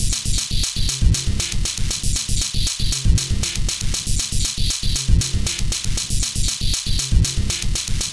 Instrument samples > Percussion
This 236bpm Drum Loop is good for composing Industrial/Electronic/Ambient songs or using as soundtrack to a sci-fi/suspense/horror indie game or short film.
Alien, Ambient, Dark, Drum, Loop, Loopable, Soundtrack, Underground, Weird